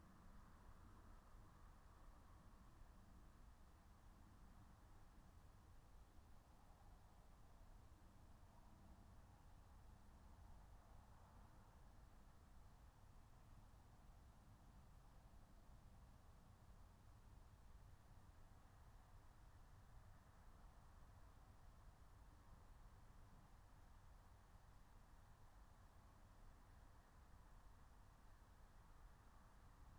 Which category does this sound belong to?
Soundscapes > Nature